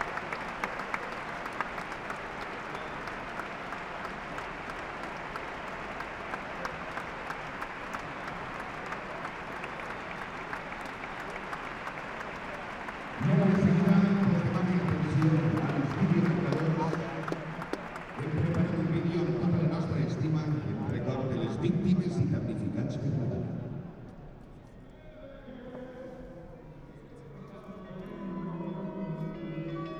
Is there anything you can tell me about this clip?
Soundscapes > Urban
#10:55 minuto de silencio por las víctimas de la DANA. #22:25 Primer gol del Valencia (Tárrega; minuto 8 de partido) #28:20 Primer "gol" del Betis (En propia de Hugo Duro; minuto 14 de partido) First part of the match played by Valencia C.F. in Mestalla Stadium. It was a post Dana match, against Betis. Valencia won 4 to 2. You can hear the minute of silence. Recorded using the Sony PCM M10 internal mics in Low Gain setting. With gain between 2 and 3. I still had to tweak the hottest parts with Izotope RX 11. ····················································· Primera parte del partido del Valencia C.F. en el Mestalla. Partido post DANA, contra el Betis. Ganó el Valencia 4 a 2. Se escucha el minuto de silencio. Grabado con los micros internos de la Sony PCM M10 en configuración LOW Gain. Con la rosca entre el 2 y 3. Aún así he tenido que de clipar algún momento con el Izotope RX 11. Exportado en Protools a 16bits para poder subirlo aquí (limita a 1GB).
soccer, valencia, ambiente, Mestalla, estadio, dana, ambient, futbol